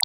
Instrument samples > Percussion
Organic-Water Snap 10.4
This snap synthed with phaseplant granular, and used samples from bandlab's ''FO-REAL-BEATZ-TRENCH-BEATS'' sample pack. Processed with multiple ''Khs phaser'', and Vocodex, ZL EQ, Fruity Limiter. Enjoy your ''water'' music day! Hi! Welcome to my EDM Production-Ultimate Snap Sample Pack! Here's the ''Organic'' session, but you can also use these samples in ''Botanical'' or ''Glitch'' even else type music.